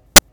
Sound effects > Experimental
noise click
just kinda some noise lol.